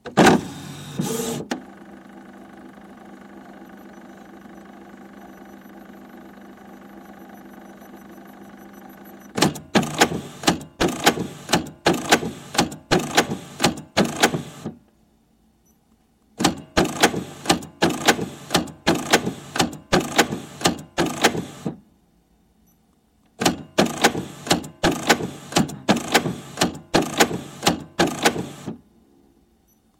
Sound effects > Objects / House appliances
canon pixma print test page
Recording of a Canon Pixma TS3720 all-in-one printer. To get this recording, the microphones were placed inside the edge of the printer. Then we set the printer off. Do not attempt this yourself without an expert assisting you, as I had a printers expert with me when setting this up.
Pixma, computer, printer, printing, Canon, nk-jet, technology, Canon-Pixma, work, ASMR, robot, nostalgia, ink-jet